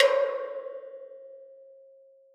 Instrument samples > Percussion
Chinese Opera SFX 4
Retouched a cow bell sample from phaseplant factory sample pcak with phase plant.
China, Chinese, Effect, FX, Opera, Percussion, SFX